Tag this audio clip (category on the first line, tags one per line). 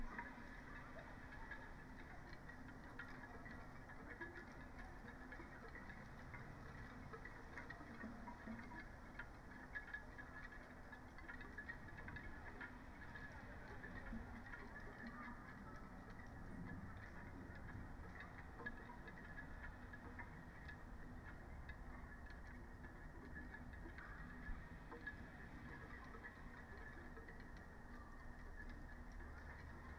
Soundscapes > Nature
modified-soundscape
weather-data
data-to-sound
natural-soundscape
field-recording
Dendrophone
nature
raspberry-pi
soundscape
phenological-recording
alice-holt-forest
sound-installation
artistic-intervention